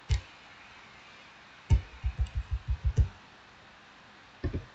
Sound effects > Human sounds and actions
tapping my microphone
tap, thud, thump